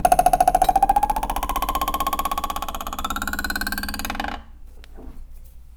Sound effects > Objects / House appliances
knife and metal beam vibrations clicks dings and sfx-033
a collection of sounds made with metal beams, knives and utensils vibrating and clanging recorded with tascam field recorder and mixed in reaper
Foley, Trippy, Metal, ding, Beam, FX, Vibration